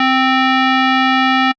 Instrument samples > Synths / Electronic
Yamaha FM-X engine waveform